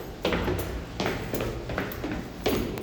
Sound effects > Human sounds and actions
FEETHmn steps down the stairs DOI FCS2
Footsteps down the stairs
down, footsteps, stairs, steps